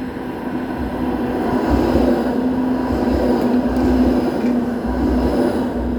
Sound effects > Vehicles
Tram passing by at moderate speed (30 kph zone) on a track embedded in asphalt. Recorded in Tampere, Finland, in December 2025 in a wet weather with mild wind. May contain slight background noises from wind, my clothes and surrounding city. Recorded using a Samsung Galaxy A52s 5G. Recorded for a university course project.